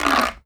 Sound effects > Objects / House appliances
PLASMisc-Blue Snowball Microphone, CU Tube, Stretch Nicholas Judy TDC
A tube stretch.
Blue-Snowball, tube, Blue-brand, stretch, foley, cartoon